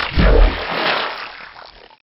Sound effects > Natural elements and explosions
• I applied Spectralizer on WaveLab 6. • I created brown noise on WaveLab 11 and I made Audition to mimic the envelope of the main waveform. • I applied Restoration on WaveLab 11. • I EQed out the nasty frequencies. • I merged/blended/mixed the original file with the attenuated effect file. The resulting wavefile is NOT superior because the initial waveform was unclearly recoded. The correct thing to do is to ask AI the best microphone for a particular job, then buy a good Chinese clone (the term clone is legally vague; it's not necessarily illegal because it has various interpretations), ask AI how to record correctly the specific thing, and RE-RECORD!
aqueous, boggy, crapdrop, damp, douse, drain, drip, fenny, fluid, hydrous, liquefied, liquid, marshy, miry, muddy, nature, plunge, saturated, shit, sodden, soggy, splash, splatter, squelchy, swampy, water, waterlogged, watery, wet